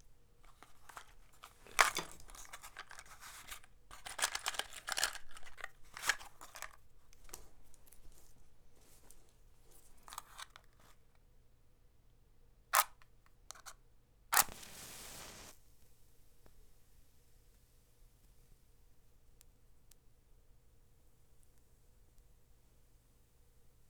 Sound effects > Objects / House appliances
matchstick strasbourg - 2022